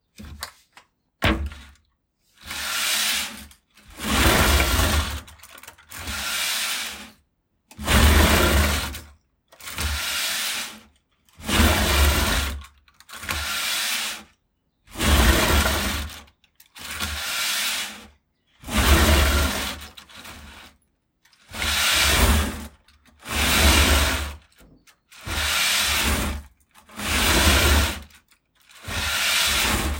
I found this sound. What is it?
Sound effects > Objects / House appliances
Broom - Cleaning a hard wooden floor
Clean a parquet floor with a hard wicker broom. * No background noise. * No reverb nor echo. * Clean sound, close range. Recorded with Iphone or Thomann micro t.bone SC 420.
hardfloor, floor, brush, wood, wicker, sweeping, sweep, loft, witch, dust, wooden, cleaning, clean, broom, wipe, parquet, attic